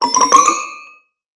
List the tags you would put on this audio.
Sound effects > Electronic / Design
game interface ui

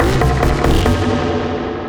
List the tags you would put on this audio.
Instrument samples > Synths / Electronic
bass clear low stabs sub subbass subwoofer synth wavetable wobble

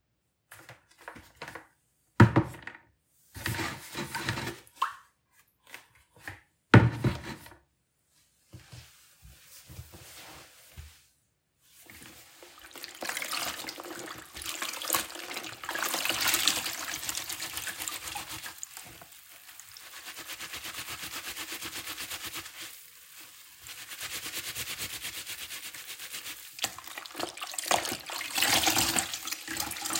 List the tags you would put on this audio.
Sound effects > Objects / House appliances
washing drip liquid cleanse bathroom laundry textile bath scrub stain wring rub handwash sink manual cloting clean bucket water tub wash clothes

